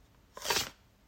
Sound effects > Objects / House appliances
Ram rod slide in
This is meant to be the sound of a ram rod sliding back into its under-barrel slot in an 1801 pattern pistol. Made with Casio fx-83GT PLUS calculator sliding into its case
slide, ramrod